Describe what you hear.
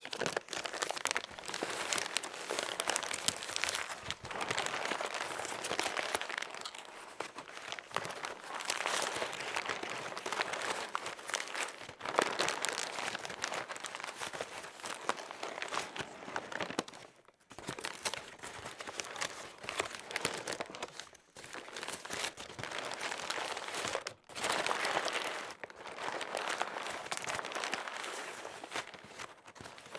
Sound effects > Objects / House appliances
A recording of paper packaging being handled, squashed and squeezed. Recorded using Zoom F3. Rode NTG4. Dual Mono.
handling, packaging, paper, rustling, scrunched, squeezed